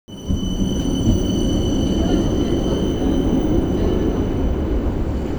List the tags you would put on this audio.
Sound effects > Vehicles
vehicle rail tram

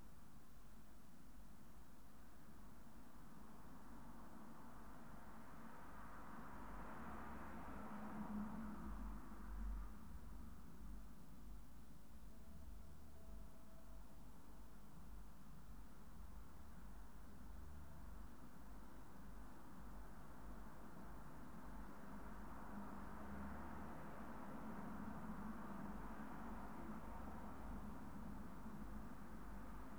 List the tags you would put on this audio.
Soundscapes > Indoors
city room evening window cars Georgia small

